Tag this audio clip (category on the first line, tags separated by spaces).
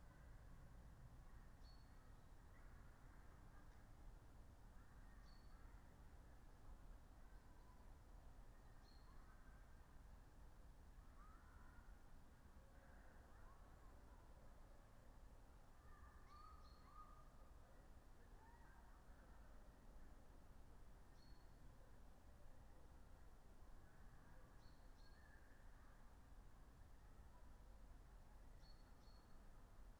Soundscapes > Nature
soundscape raspberry-pi alice-holt-forest modified-soundscape sound-installation weather-data field-recording nature artistic-intervention natural-soundscape Dendrophone data-to-sound phenological-recording